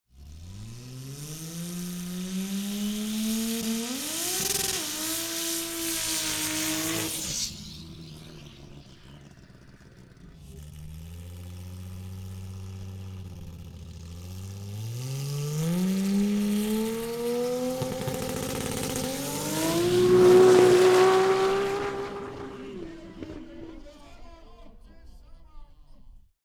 Soundscapes > Other
Sounds from Wildcards Drag Race 2025